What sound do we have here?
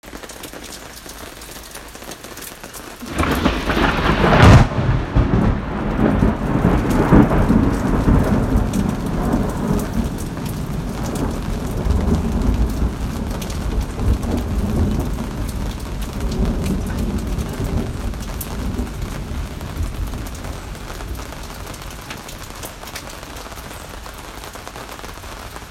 Soundscapes > Nature
Light rain with a loud thunderclap